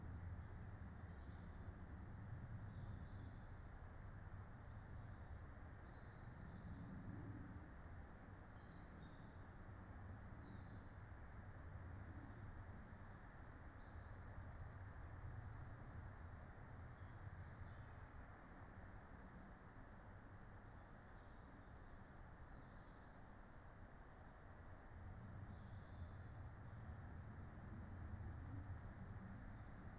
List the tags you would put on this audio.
Soundscapes > Nature
soundscape
alice-holt-forest
Dendrophone
data-to-sound
weather-data
artistic-intervention
phenological-recording
field-recording
sound-installation
modified-soundscape
nature
natural-soundscape
raspberry-pi

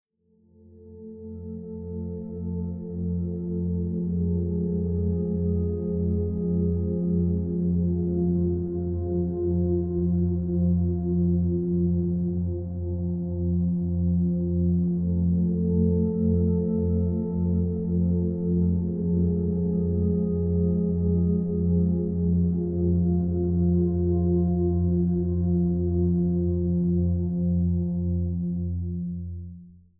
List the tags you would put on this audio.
Soundscapes > Synthetic / Artificial
background
cinematic
creepy
dark
eerie
horror
mysterious
scary
sinister
suspense
thriller

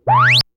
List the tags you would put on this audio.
Sound effects > Experimental
alien
analog
analogue
bass
basses
bassy
complex
dark
effect
electro
electronic
fx
korg
machine
mechanical
oneshot
pad
retro
robot
robotic
sample
sci-fi
scifi
sfx
snythesizer
sweep
synth
trippy
vintage
weird

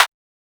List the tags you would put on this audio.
Instrument samples > Percussion
percussion
8-bit
game
FX

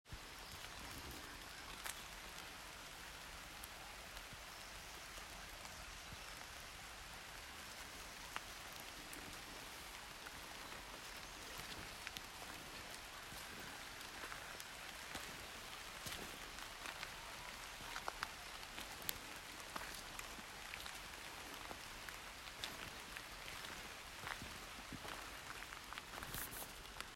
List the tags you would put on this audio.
Soundscapes > Nature
nature
birdsong
rain
forest
leaves
field-recording

